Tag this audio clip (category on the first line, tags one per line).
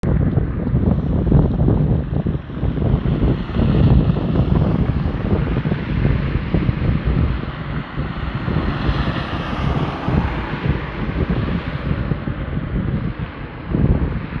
Soundscapes > Urban
driving
car
tyres
city